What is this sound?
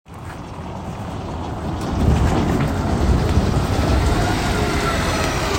Soundscapes > Urban
Bus passing by 22
Where: Hervanta Keskus What: Sound of a bus passing by Where: At a bus stop in the evening in a cold and calm weather Method: Iphone 15 pro max voice recorder Purpose: Binary classification of sounds in an audio clip